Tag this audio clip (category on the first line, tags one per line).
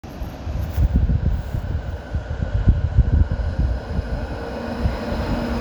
Soundscapes > Urban
city
passing
tram